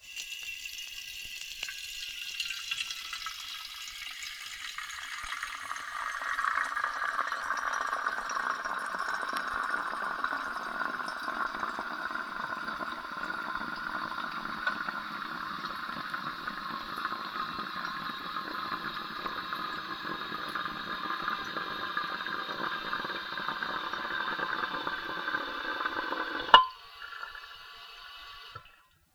Sound effects > Experimental
contact mic in metal thermos, filling with water slowly2
Water slowly filling a giant metal thermos recorded with a contact microphone.
contact-mic, contact-microphone, experimental, thermos, water, water-bottle